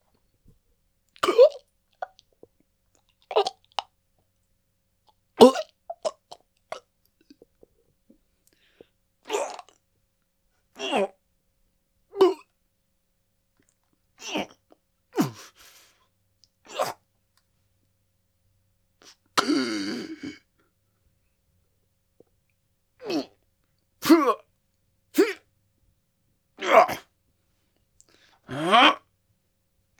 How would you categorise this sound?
Sound effects > Human sounds and actions